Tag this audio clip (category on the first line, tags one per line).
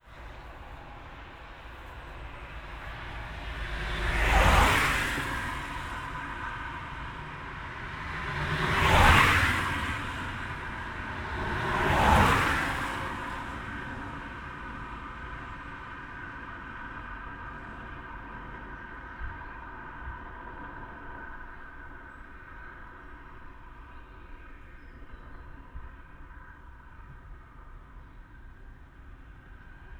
Soundscapes > Urban
cars
field-recording
passing
road
street
town
traffic
UK